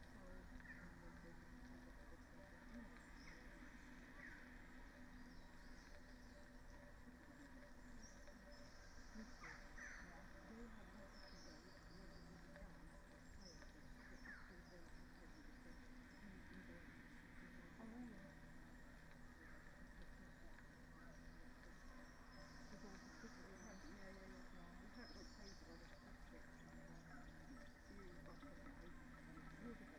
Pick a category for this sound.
Soundscapes > Nature